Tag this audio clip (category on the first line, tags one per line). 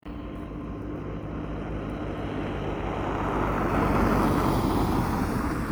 Sound effects > Vehicles
car
vehicle